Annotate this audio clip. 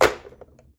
Sound effects > Objects / House appliances
TOYMisc-Samsung Galaxy Smartphone, CU Chatter Telephone, Slam Receiver Nicholas Judy TDC
Slamming a chatter telephone receiver.
slam, chatter-telephone, Phone-recording, receiver